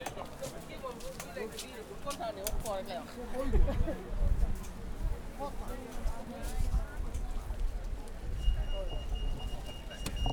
Soundscapes > Urban
LNDN SOUNDS 037
Recordings from near a street market stall in a mostly Indian / Bangladeshi neighborhood of London. Quite noisy and chaotic. Unprocessed sound, captured with a Zoom H6
bustling, city, london, market, neighbourhood